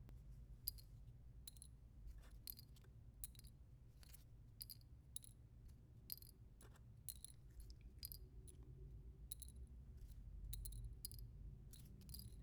Sound effects > Objects / House appliances
item drop matchsticks individually on cold floor
Dropping used matchsticks individually on a cold floor. Recorded with Zoom H2.